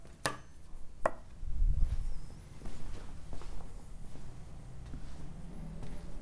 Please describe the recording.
Sound effects > Other mechanisms, engines, machines
metal shop foley -236
foley, wood, sfx, strike, tools, bop, knock, sound, tink, rustle, metal, pop, bang, boom, perc, percussion, shop, little, bam, fx, crackle, thud, oneshot